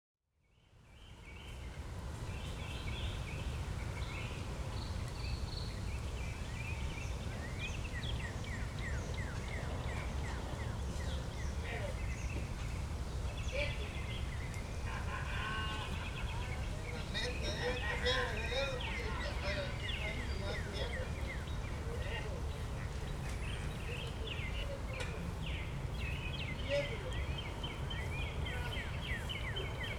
Sound effects > Natural elements and explosions
Suburban American Spring Meadow - Breeze, Birds, Metro Trains
atmosphere, relaxing, spring